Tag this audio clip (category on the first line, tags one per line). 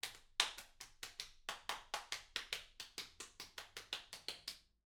Sound effects > Human sounds and actions
individual
NT5
Applause
FR-AV2
Tascam
Applauding
AV2
Solo-crowd
clap
Rode
Applaud
clapping
XY
person
solo
indoor